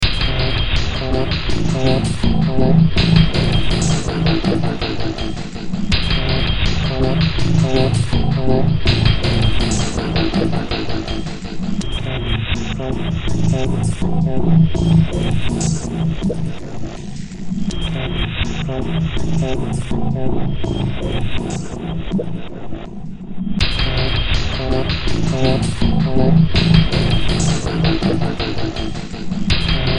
Music > Multiple instruments
Demo Track #3441 (Industraumatic)
Ambient, Sci-fi, Horror, Industrial, Noise, Soundtrack, Cyberpunk, Underground, Games